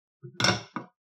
Sound effects > Human sounds and actions
Place the frying pan on the stove
Recorded on a Galaxy Grand Prime smartphone
action, frying, pan, person, stove